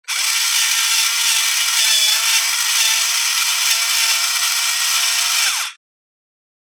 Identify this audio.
Sound effects > Objects / House appliances
electric-pepper-mill
An electric grinder buzzing as it crushes pepper. Recorded with Zoom H6 and SGH-6 Shotgun mic capsule.